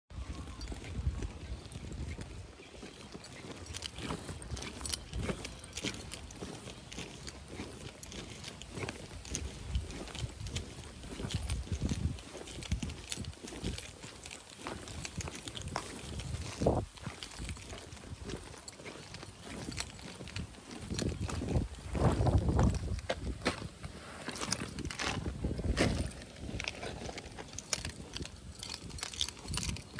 Soundscapes > Urban
Walking to car and driving ambience
A simple stereo recording of walking to my car and driving away. Recorded on iPhone SE (3rd Gen).